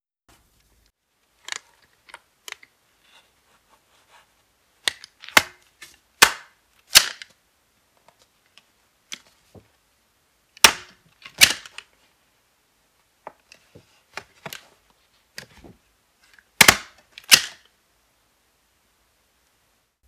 Sound effects > Objects / House appliances
Stapler stapling papers together